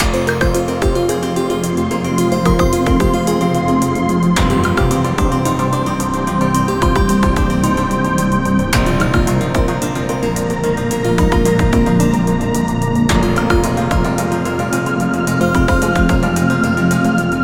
Music > Multiple instruments
Protoplanetary Loop (110 bpm, 8 bars) 1
110-bpm, 110-bpm-8-bar-loop, 110-bpm-loop, 8-bar-loop, ambient-music-loop, energetic-music-loop, music-loop, sci-ambient-theme, sci-fi-110-bpm-beat, sci-fi-110-bpm-loop, sci-fi-110-bpm-music-loop, sci-fi-ambient-music, sci-fi-beat, sci-fi-intro, sci-fi-loop, sci-fi-music-loop, sci-fi-music-theme, sci-fi-outro, sci-fi-podcast, upbeat-sci-fi-loop, upbeat-sci-fi-music-loop